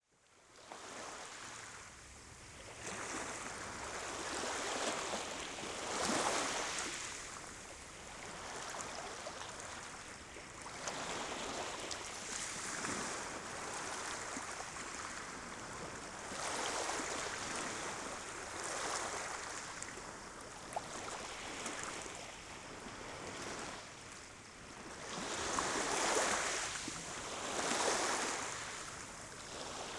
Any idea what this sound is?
Soundscapes > Nature
250814 212123 PH Masasa beach at night binaural
Masasa Beach at night (binaural, please use headset for 3D effects). I made this binaural recording at night, on a beautiful sand beach called Masasa beach, located in the south of Tingloy island, in Batangas province, Philippines. One can hear waves and wavelets lapping the sand, and a cricket. Recorded in August 2025 with a Zoom H5studio and Ohrwurm 3D binaural microphones. Fade in/out and high pass filter at 60Hz -6dB/oct applied in Audacity. (If you want to use this sound as a mono audio file, you may have to delete one channel to avoid phase issues).